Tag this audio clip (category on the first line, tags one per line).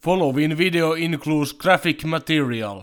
Speech > Solo speech
english
movies
speech
video
voice
warning